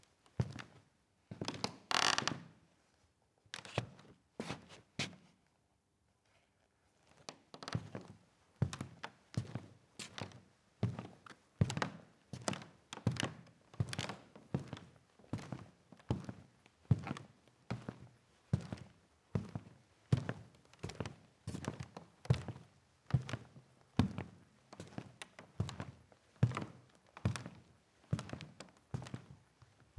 Sound effects > Human sounds and actions
footsteps Logellou1
Footsteps on a wooden floor in the "Paranthoën" big room of the Logelloù artistic center, Penvénan, Bretagne. "Normal" pace, heavy leather shoes. Mono recording, MKH50 / Zoom F8.
feet, footsteps, logellou, parquet, shoes, steps, walk, walking, wood, wooden-floor